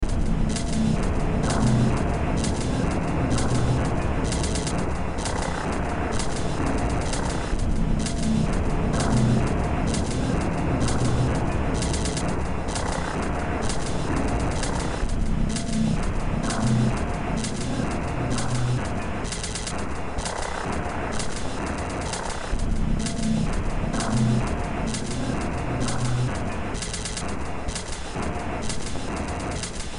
Music > Multiple instruments
Demo Track #3889 (Industraumatic)
Horror, Industrial, Noise, Games